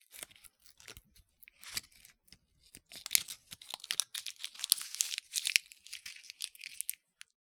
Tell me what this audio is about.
Sound effects > Objects / House appliances
Unwrapping a Sweet
Slowly unwrapping a plastic sweet wrapper.
crack, crackle, crackling, crush, foley, package, peel, plastic, pop, snap, sweet, unwrapping